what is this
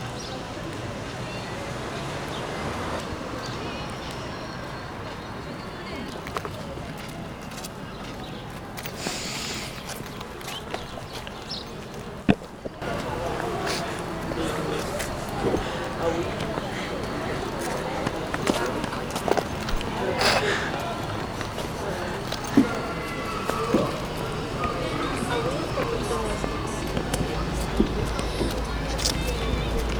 Soundscapes > Urban
20251114 PlacaAllende Birds Humans Cars Bus Song Noisy Complex
Humans, Cars, Birds, Noisy, Bus, Complex, Song